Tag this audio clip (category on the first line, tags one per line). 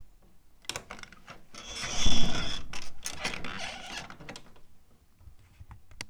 Sound effects > Objects / House appliances
Squeak
Creek
hindge
door
Wooden